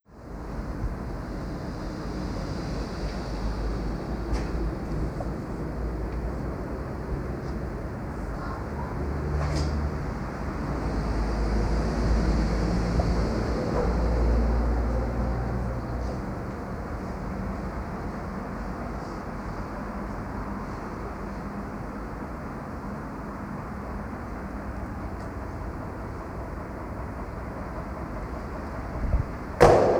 Soundscapes > Urban
081 SANTACLARA TUNNEL TRAFFIC CLAPS 1
claps, tunnel